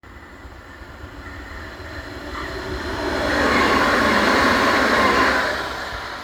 Soundscapes > Urban
The sound of a passing tram recorded on a phone in Tampere.
Field-recording, Tram, Railway